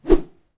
Sound effects > Natural elements and explosions
Stick - Whoosh 1
SFX, whoosh, stick, NT5, tascam, Swing, FR-AV2, swinging, Rode, Transition, fast, Woosh, whosh